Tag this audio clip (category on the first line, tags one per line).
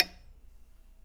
Sound effects > Objects / House appliances
fx
percussion
foley
hit
perc
bonk
metal
clunk
glass
industrial
fieldrecording
sfx
stab
object
foundobject
mechanical
oneshot
natural
drill